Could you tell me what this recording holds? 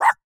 Sound effects > Animals
Small Dog Bark
The sound of a chihuahua barking. Recorded with the zoom H6 built in microphone and edited for noise reduction and brevity.
Animal, Bark, Woof, Small, Dog